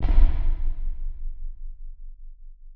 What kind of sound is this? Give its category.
Sound effects > Electronic / Design